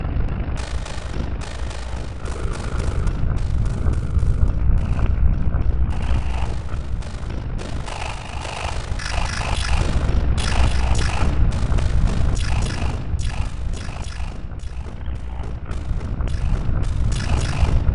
Instrument samples > Percussion
This 107bpm Drum Loop is good for composing Industrial/Electronic/Ambient songs or using as soundtrack to a sci-fi/suspense/horror indie game or short film.
Dark, Loopable, Loop, Packs, Industrial, Weird, Samples, Drum, Alien, Underground, Ambient, Soundtrack